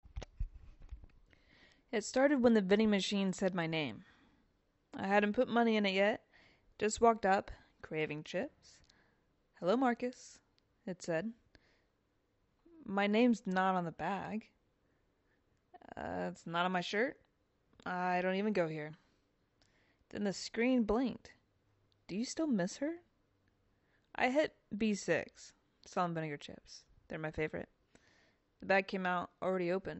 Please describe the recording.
Speech > Solo speech
“The Vending Machine Knows My Name” (surreal / absurd horror / deadpan weird)
A deadpan descent into vending machine madness. Bizarre, slightly creepy, and oddly emotional—perfect for strange audio fiction or voice acting with a twist. Script: It started when the vending machine said my name. I hadn’t put money in yet. Just walked up, craving chips. “Hello, Marcus,” it said. My name’s not on my badge. Not on my shirt. I don’t even go here. Then the screen blinked: “Do you still miss her?” I hit B6. Salt & vinegar. The bag came out already open. Yesterday it offered me forgiveness. Today it offered a knife and a choice. I think it’s trying to help. The weird part? The chips are still really good.
Script, absurd, weirdfiction, surrealhorror